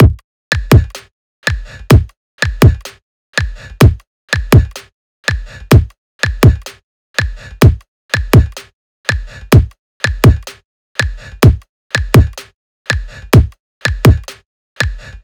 Music > Multiple instruments
126 - Psycho Beat
126bpm, beat, break, drums, multipleinstruments, music, psychobeat, reverb, tonfabrik